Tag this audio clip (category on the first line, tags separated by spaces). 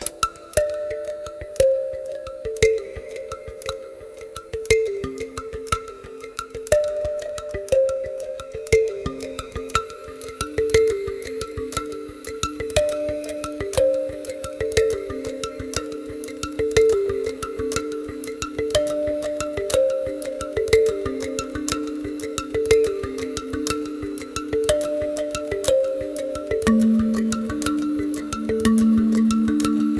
Music > Multiple instruments
background relaxing atonal texture tribal organic meditative ambient experimental earth pad soil soundscape